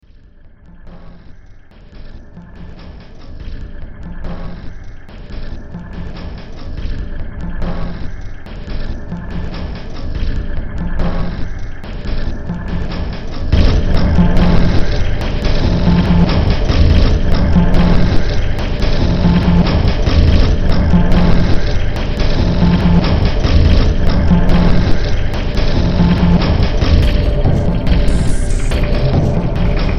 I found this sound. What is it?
Music > Multiple instruments
Demo Track #3076 (Industraumatic)
Underground, Soundtrack, Industrial, Noise, Horror, Games, Cyberpunk, Sci-fi, Ambient